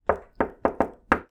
Sound effects > Objects / House appliances
Knocking on a shelf.